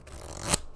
Sound effects > Objects / House appliances
GAMEMisc-Blue Snowball Microphone Cards, Shuffle 10 Nicholas Judy TDC
Blue-brand, Blue-Snowball, cards, foley, shuffle